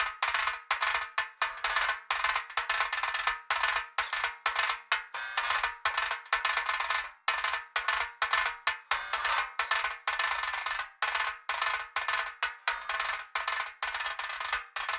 Music > Solo percussion
prepared guitar percussion loop
acoustic, guitar, techno